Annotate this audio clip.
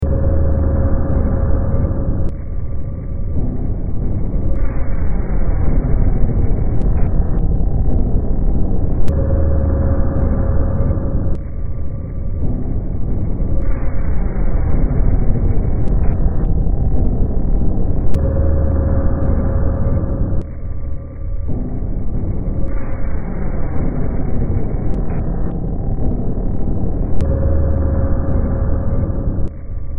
Music > Multiple instruments
Demo Track #3286 (Industraumatic)
Soundtrack, Horror, Games, Sci-fi, Noise, Underground, Ambient, Industrial, Cyberpunk